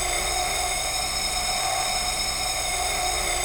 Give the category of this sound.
Sound effects > Electronic / Design